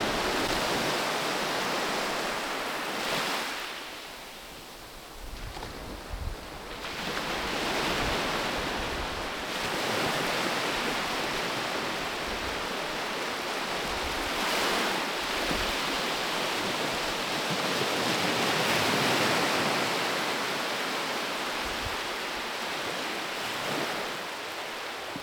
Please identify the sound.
Soundscapes > Nature
SeaShore Wave 2
nature, sea, seashore